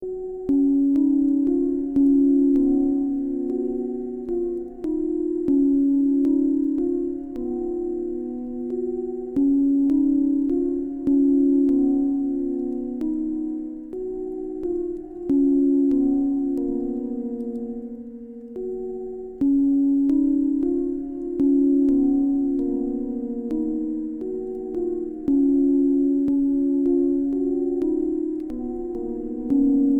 Music > Solo instrument
Summer in school halls music
This is a music what I made in Roblox piano. This music about School halls in summer, cuz school halls are empty at summer because no students are there. So yeah. I hope you enjoy. :3
cinematic, dramatic, music, piano